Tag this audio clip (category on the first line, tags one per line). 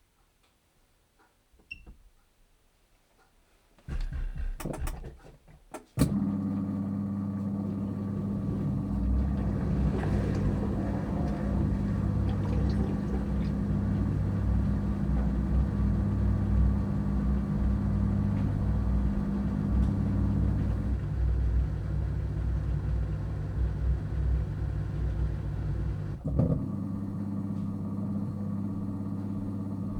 Sound effects > Objects / House appliances
Dji-mic3
omni